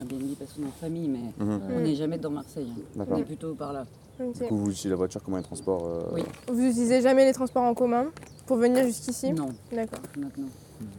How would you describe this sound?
Speech > Conversation / Crowd
couple-de-touriste Zetcg0T6
2 people talking with us